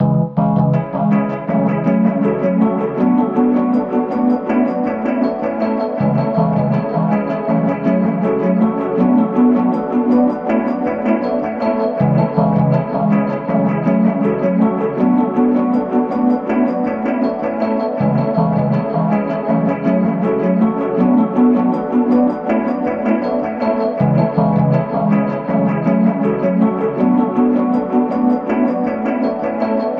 Instrument samples > Piano / Keyboard instruments
Slow Ethereal Piano loop 80bpm
ambient, loop, piano, relaxed, serene, tranquil